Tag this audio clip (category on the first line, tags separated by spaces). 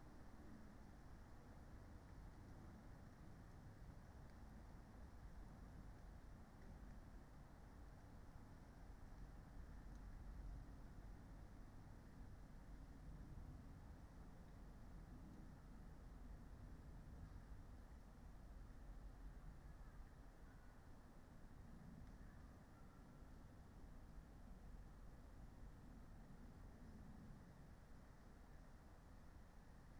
Soundscapes > Nature
alice-holt-forest field-recording raspberry-pi sound-installation